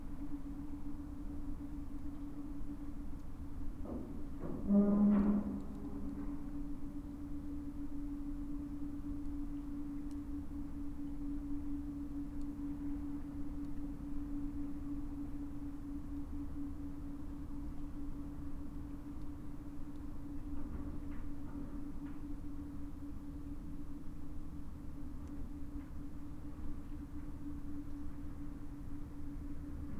Soundscapes > Urban
AMBTown Road by the water with few cars, distant ferry late in the evening, Karlskrona, Sweden
Recorded 15:27 09/11/25 It’s a dark November evening on the quiet side of Bryggarberget. You can hear the water lapping and individual leaves moving. In the beginning the ferry arrives across the water, letting off cars. Cars pass by on the road from time to time, and one bike. A heron flies past at #5:59. Zoom H5 recorder, track length cut otherwise unedited.